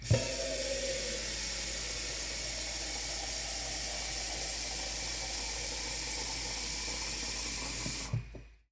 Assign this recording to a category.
Sound effects > Natural elements and explosions